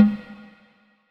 Music > Solo percussion
Snare Processed - Oneshot 194 - 14 by 6.5 inch Brass Ludwig
oneshot, roll, rimshots, crack, realdrum, kit, snaredrum, hit, fx, snare, acoustic, ludwig, flam, rim, hits, brass, beat, snares, rimshot, snareroll, sfx, drum, perc, drumkit, drums, reverb, processed, percussion, realdrums